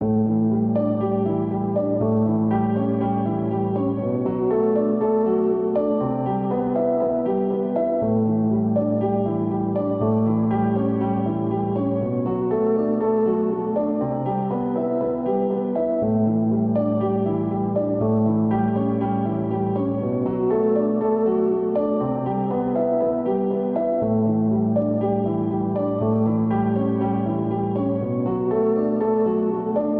Music > Solo instrument
Piano loops 027 efect 4 octave long loop 120 bpm
120, simplesamples, music, simple, loop, piano, reverb, samples, free, 120bpm, pianomusic